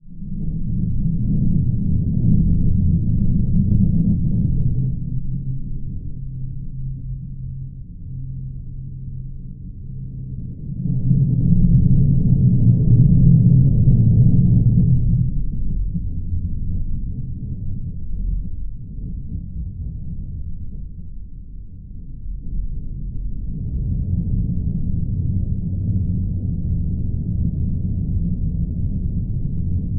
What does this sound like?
Sound effects > Other mechanisms, engines, machines
fx train window geofon kengwai cct
LOM Geofon attached to the window of a belgian train carriage. Recorded with a Tascam FR-AV2